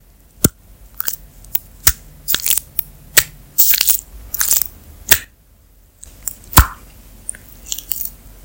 Sound effects > Other
Slime moving: slow-moving, squishy, squash, wet, squelching. Slime moves with a slurping sound, slowly, multiple times. Gelatinous. Recorded with iPhone. The sound was processed to eliminate background static. Recorded using slime mixing with dough being smacked against plastic wrap.